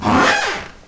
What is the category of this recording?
Sound effects > Objects / House appliances